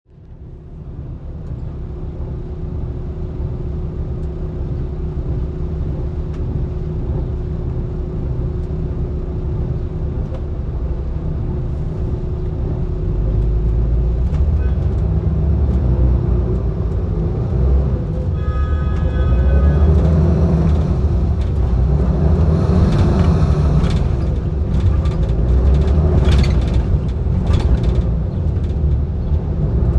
Soundscapes > Urban
Soundscape from inside an express bus in Manhattan Recorded on my iPhone